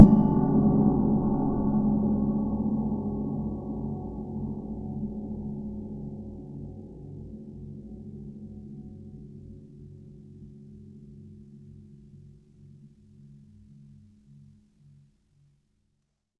Music > Solo instrument
Gong Cymbal -001
Oneshot, Ride, Perc, Paiste, Drum, Metal, Drums, Hat, Custom, Crash, GONG, Kit, Cymbals, Sabian, Percussion, Cymbal, FX